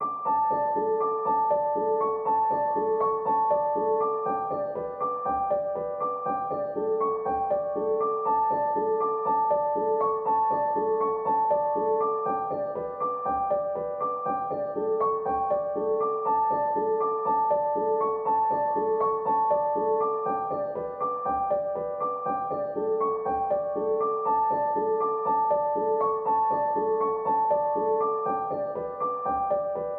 Music > Solo instrument
Piano loops 198 octave up short loop 120 bpm
simple, 120, simplesamples, reverb, samples, music, piano, loop, free, 120bpm, pianomusic